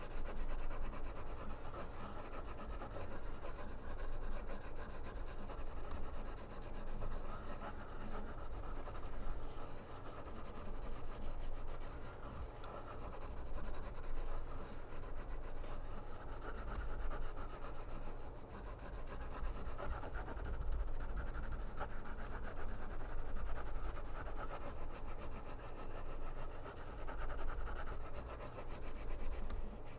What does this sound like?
Sound effects > Objects / House appliances
OBJWrite-Blue Snowball Microphone Crayon, Writing, Drawing, Scribbling Nicholas Judy TDC
A crayon writing, drawing or scribbling.
Blue-brand, Blue-Snowball, crayon, draw, foley, scribble, write